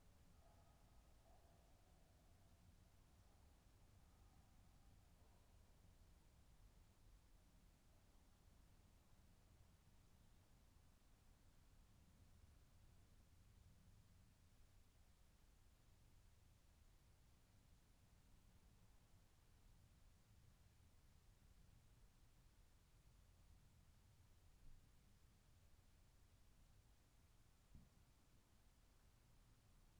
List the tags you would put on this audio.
Soundscapes > Nature
natural-soundscape
phenological-recording
soundscape
alice-holt-forest
artistic-intervention
weather-data
nature
modified-soundscape
sound-installation
raspberry-pi
field-recording
Dendrophone
data-to-sound

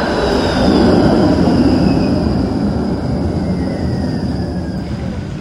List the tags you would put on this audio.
Sound effects > Vehicles
city
urban
Tram

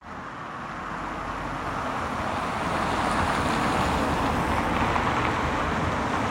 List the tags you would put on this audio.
Soundscapes > Urban
car
traffic
vehicle

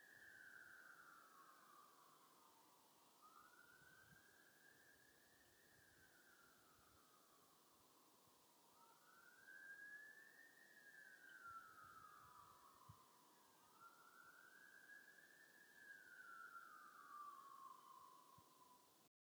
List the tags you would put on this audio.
Soundscapes > Urban
Night
Passing
Police
Siren
Speed
Vehicle

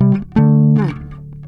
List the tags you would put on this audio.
Instrument samples > String
loop
mellow
pluck
blues
fx
loops
slide
oneshots
rock
bass
plucked
funk
riffs
electric
charvel

